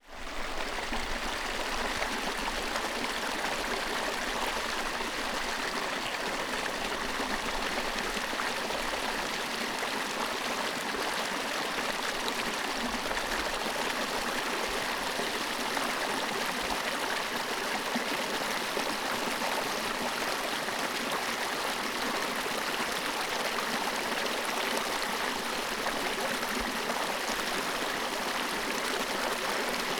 Soundscapes > Nature
Babbling brook
The sound of a stream in northern Sweden flowing quite heavily.
babbling, brook, creek, flow, flowing, nature, relaxing, river, stream, water